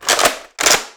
Sound effects > Other mechanisms, engines, machines
Heavy reload
Here is a heavy gun reload sound I recorded in Audacity from a toy gun cocking.